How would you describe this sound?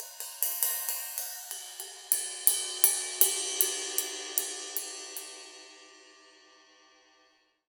Music > Solo instrument
Cymbal Combination Multi-003
drum kit samples recorded in my studio and processed via Reaper
Percussion, Perc, Drums, kit